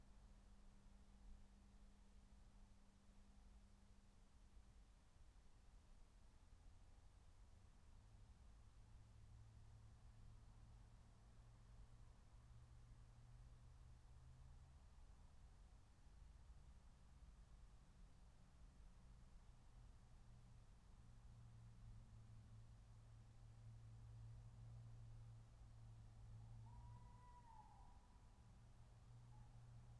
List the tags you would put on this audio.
Soundscapes > Nature
meadow
natural-soundscape
nature
raspberry-pi
soundscape
alice-holt-forest
phenological-recording
field-recording

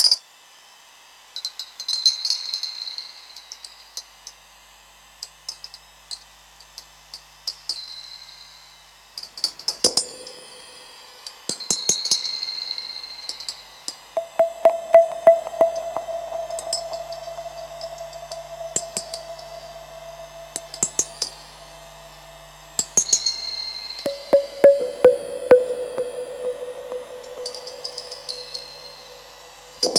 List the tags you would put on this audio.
Music > Multiple instruments
atonal
soundscape
soil
ambient

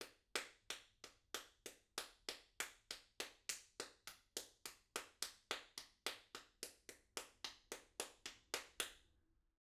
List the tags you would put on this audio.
Sound effects > Human sounds and actions
Solo-crowd
solo
Rode
Applause
Applauding
AV2
indoor
NT5
FR-AV2
person
Applaud
clapping
XY
individual
clap
Tascam

